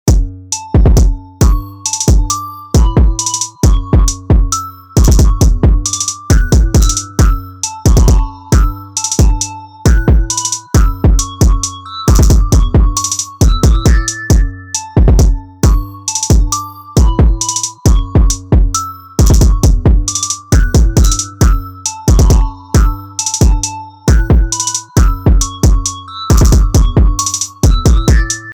Music > Multiple instruments
New Beginnings Ringtone
This is a nice ringtone to have for people seeking happy, uplifting sounds to put in their mobile phones.
android, beat, beats, happy, instrumental, instrumentals, iphone, music, ringtone, ringtones